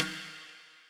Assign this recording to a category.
Music > Solo percussion